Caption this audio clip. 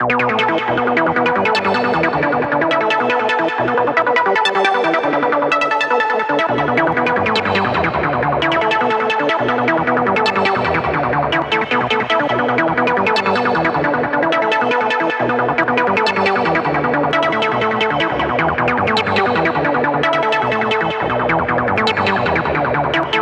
Music > Multiple instruments
Shanghai Highway
Cool sample I made in ableton live
synth, electronic, loop